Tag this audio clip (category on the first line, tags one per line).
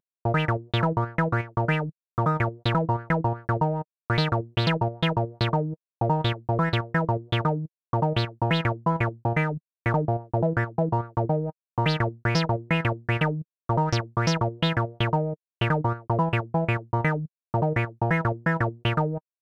Music > Solo instrument
303
Acid
electronic
hardware
house
Recording
Roland
synth
TB-03
techno